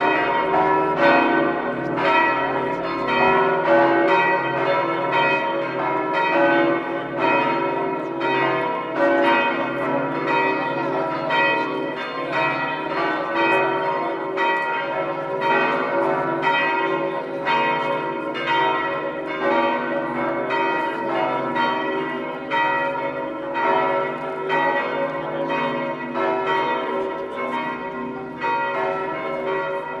Soundscapes > Urban
Bells in Assisi
I've recorded the bells from the Saint Francis Basilica in Assisi. I've recorded them with a Tascam Dr 40-x and then I've used a denoiser, some eq and mastering. Enjoy DIY.
church, bells, field-recording, Italy